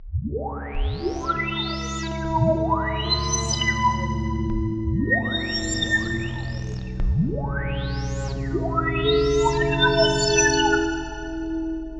Soundscapes > Synthetic / Artificial
PPG Wave 2.2 Boiling and Whistling Sci-Fi Pads 15
dark-soundscapes, dark-design, scifi, content-creator, science-fiction, vst, cinematic, dark-techno, PPG-Wave, sound-design, drowning, sci-fi, noise-ambient, mystery, horror, noise